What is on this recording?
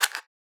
Sound effects > Objects / House appliances
Matchsticks ShakeBox 6 Shaker
matchstick, matchstick-box, shaker